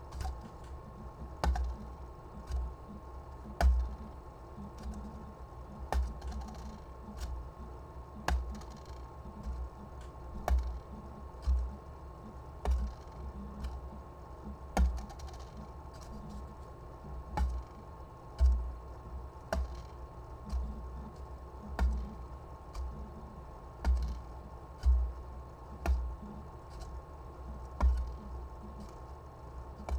Sound effects > Objects / House appliances
A plastic cup picking up and setting down.